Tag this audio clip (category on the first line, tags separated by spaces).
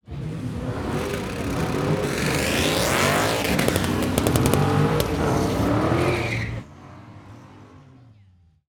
Soundscapes > Other
2025 Drag